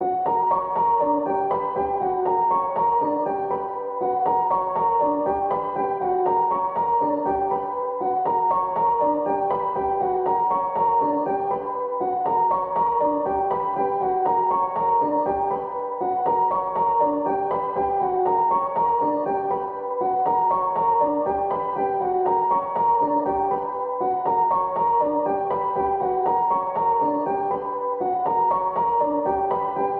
Music > Solo instrument
Piano loops 103 efect 4 octave long loop 120 bpm
Beautiful piano harmonies. VST/instruments used . This sound can be combined with other sounds in the pack. Otherwise, it is well usable up to 4/4 120 bpm.